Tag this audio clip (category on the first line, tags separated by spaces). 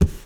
Sound effects > Objects / House appliances
container metal scoop spill bucket lid debris hollow clatter garden cleaning carry pour object knock fill tool drop liquid kitchen water pail clang foley plastic slam handle household tip shake